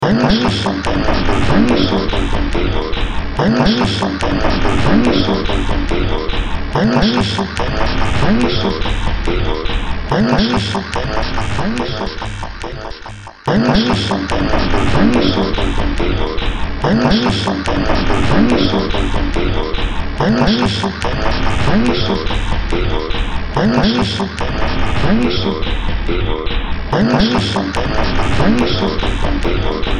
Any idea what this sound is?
Music > Multiple instruments
Short Track #3825 (Industraumatic)
Ambient, Cyberpunk, Games, Horror, Industrial, Noise, Sci-fi, Soundtrack, Underground